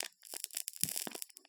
Sound effects > Objects / House appliances
Candle Burn 3 Crackle
Sample from a burning beeswax candle with a short wick, recorded with an AKG C414 XLII microphone.